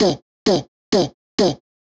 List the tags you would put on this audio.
Speech > Solo speech
One-shot
Vocal

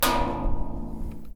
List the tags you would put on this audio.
Sound effects > Objects / House appliances
Robot
Junk
Machine
waste
dumpster
Percussion
Environment
Perc
trash
Metallic
dumping
rattle
Metal
scrape
tube
Clank
FX
Robotic
Ambience
Bash
garbage
SFX
Junkyard
Clang
Foley
Dump
rubbish
Atmosphere
Smash
Bang

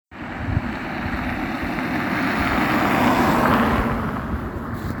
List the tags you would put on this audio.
Sound effects > Vehicles
asphalt-road
car
moderate-speed
studded-tires

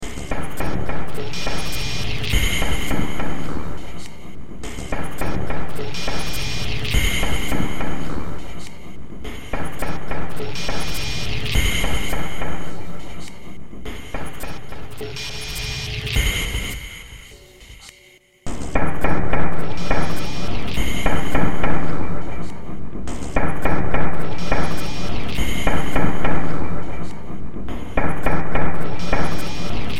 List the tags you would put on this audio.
Music > Multiple instruments
Sci-fi
Horror
Ambient
Noise
Cyberpunk
Industrial
Soundtrack
Games
Underground

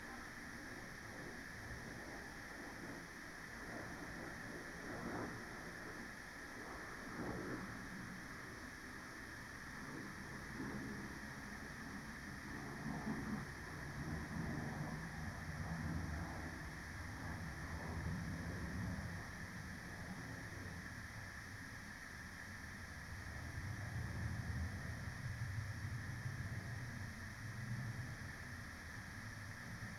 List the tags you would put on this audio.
Soundscapes > Nature
data-to-sound
weather-data
modified-soundscape
natural-soundscape
nature
phenological-recording
soundscape
field-recording
alice-holt-forest
raspberry-pi
sound-installation
artistic-intervention
Dendrophone

